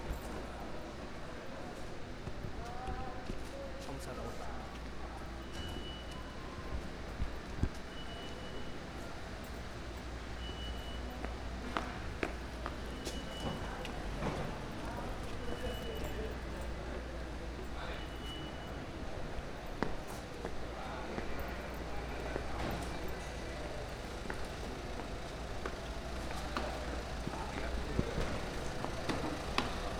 Sound effects > Human sounds and actions
20250326 MercatSantAndreu4 Humans Commerce Traffic Complex
Commerce, Complex, Humans, Traffic